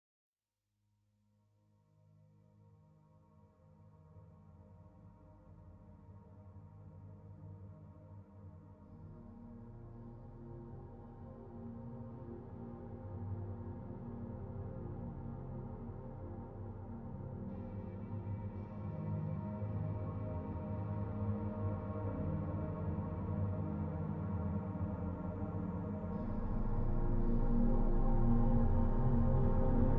Soundscapes > Synthetic / Artificial
Dark semi-melodic ambient
Made in fl studio 25 for fun. can be used for games. Vsts used: zenology, omnisphere, serum
ambient, atmosphere, dark